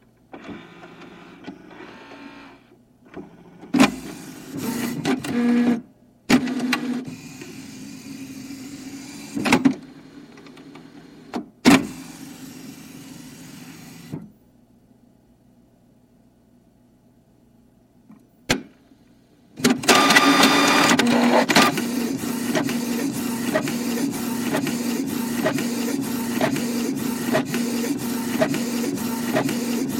Sound effects > Objects / House appliances
printing homework on Canon Pixma TR4720
Printing an 8 page Homework assignment on the Canon TR4720 printer. Doublesided printing was enabled, creating a different sound. In all, 4 pieces of paper were printed. To get this sound, my printer expert friend placed two small microphones inside the printer facing away from each other. Please do not attempt this yourself. I had a technician assist me in getting these recordings. Thank you.
Canon, TR4720, Canon-Pixma, computer, printer, office, Ink-jet, robotic